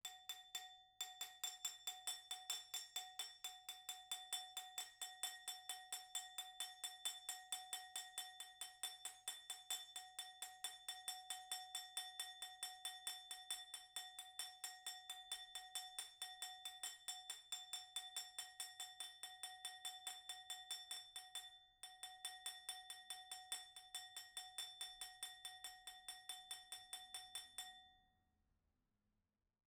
Sound effects > Other
Glass applause 34
applause, cling, clinging, FR-AV2, glass, individual, indoor, NT5, person, Rode, single, solo-crowd, stemware, Tascam, wine-glass, XY